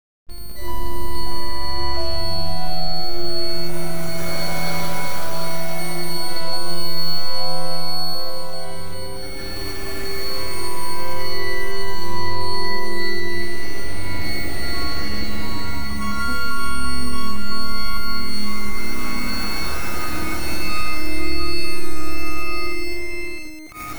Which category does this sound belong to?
Soundscapes > Synthetic / Artificial